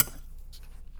Sound effects > Objects / House appliances
knife and metal beam vibrations clicks dings and sfx-116
Beam, Vibration, Klang, FX, ding, Vibrate, Wobble, metallic, Metal, Foley, ting, Trippy, Perc, Clang, SFX